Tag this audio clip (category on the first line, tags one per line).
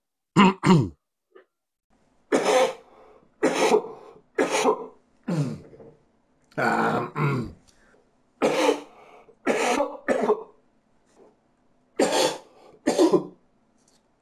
Sound effects > Other
coughs
throat